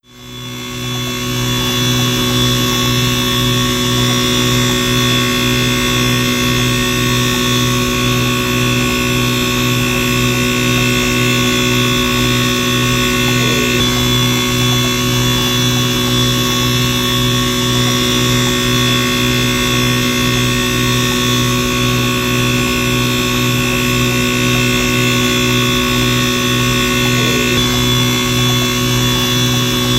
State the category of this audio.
Sound effects > Other mechanisms, engines, machines